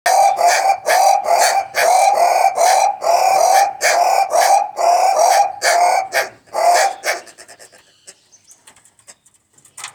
Sound effects > Animals
Miscellaneous Birds - Guinea Turacos
These are calls of the Guinea turaco, a west African green-colored bird, which were recorded with an LG Stylus 2022 at Hope Ranch Zoo.